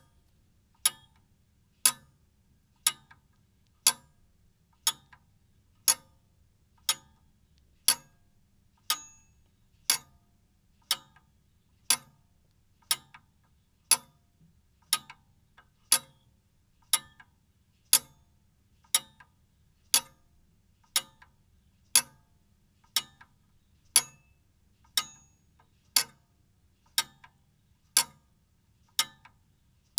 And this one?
Sound effects > Objects / House appliances
Wall clock tick from an old pendulum clock. The clock was made from scavenged parts by a Northumbrian miner and is known as a "wagadawa" (wag on the wall). Recorded using a Zoom H5 Studio and FEL Clippy XLR microphone.